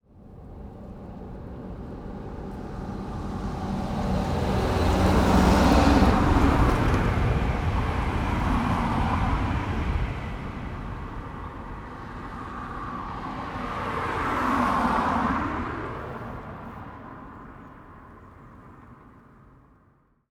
Soundscapes > Nature
A recording of a train and cars passing by.
recording, Field, residential, train, car, ambience